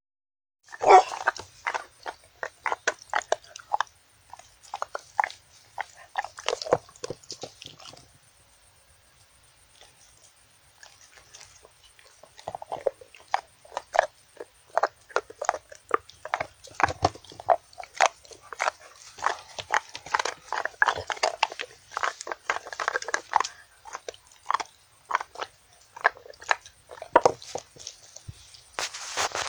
Speech > Solo speech
recorded at mobile phone